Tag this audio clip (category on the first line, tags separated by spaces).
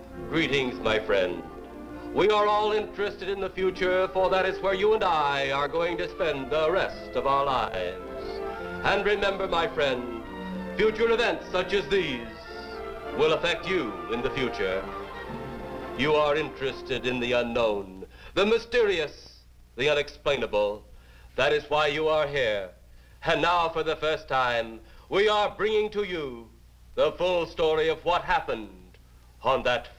Speech > Solo speech
voice monologue science-fiction music introduction movie film-music ed-wood SciFi Criswell speech male Dramatic 1957 horror explosion film